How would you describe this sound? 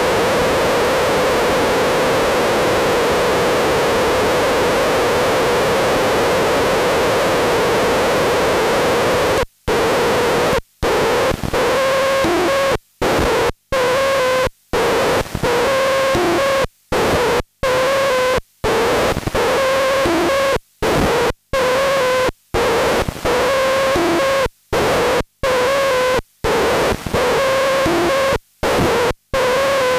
Sound effects > Objects / House appliances
(Quite loud) Weird electronic static/interference noise
Pretty loud, I've turned it down a bit from what it was. Weird noise caused seemingly caused by plugging an A/V digitising dongle into the wrong SCART port on a VCR. Weird melody at #0:10 caused by switching to a different channel. Can be used for digital static or maybe a sample for industrial or electronic music. Recorded in audacity with line-in signal from a Sony SLV-SE300.
electric, static, radio, digital, distortion, electronic, noise, glitch